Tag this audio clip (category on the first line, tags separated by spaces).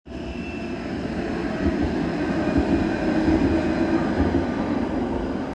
Sound effects > Vehicles
city tram public-transport